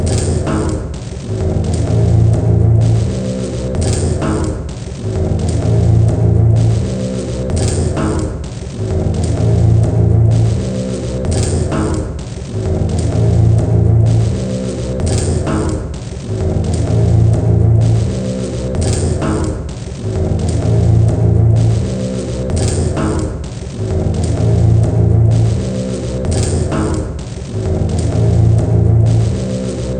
Instrument samples > Percussion
This 64bpm Drum Loop is good for composing Industrial/Electronic/Ambient songs or using as soundtrack to a sci-fi/suspense/horror indie game or short film.
Loop, Underground, Dark, Soundtrack, Weird, Industrial, Packs